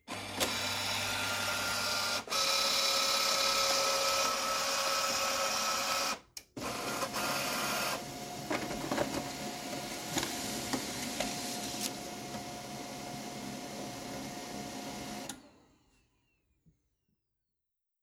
Sound effects > Objects / House appliances
MACHOffc-Samsung Galaxy Smartphone Copier, Copying Paper, Printing Out Nicholas Judy TDC
A copier copying paper and printing out paper.
copier, copy, paper, Phone-recording, print-out